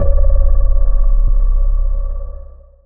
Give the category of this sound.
Instrument samples > Synths / Electronic